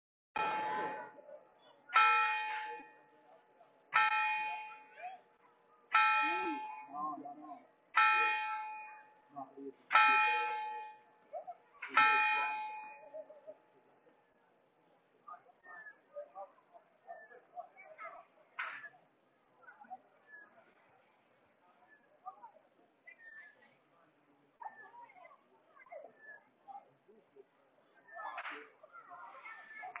Soundscapes > Urban
Bells at a beach - Spiaggia Di Ponente, Genoa, Italy
Recording of church bells at a beach on the Genoan coast, Italy. 9/9/25
ambience, beach, bell, bells, coastal, nautical